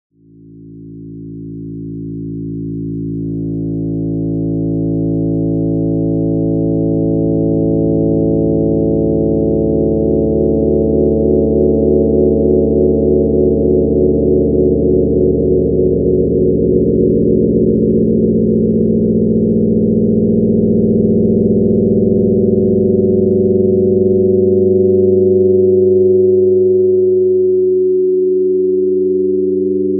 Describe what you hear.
Music > Solo instrument
This is my experiment with modulation that lead to creation of dark heavy pads